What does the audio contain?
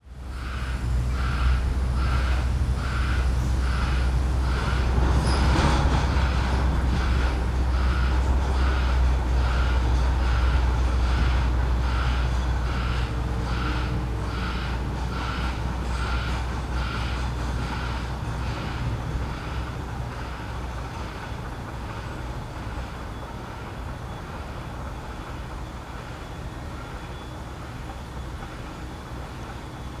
Soundscapes > Urban
A recording of a construction vehicle moving around a site.
ambience, field, recording